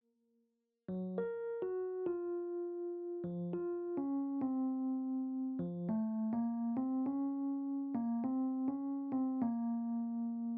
Music > Solo instrument
Apple A Day Vintage Keys Harmonies 2 - 102BPM A# Minor
Harmony layer for vintage keys in A# minor at 102 BPM. Made using the Vintage Keys pack for Spitfire LABS in REAPER. Second of two parts.
keys, keyboard, harmony, melody, vintage